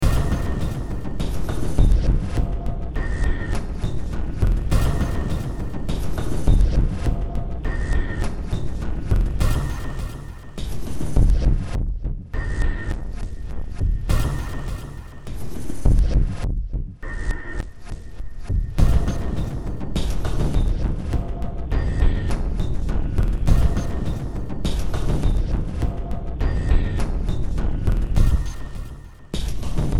Music > Multiple instruments
Demo Track #3770 (Industraumatic)
Underground, Noise, Sci-fi, Ambient, Cyberpunk, Horror, Games, Soundtrack, Industrial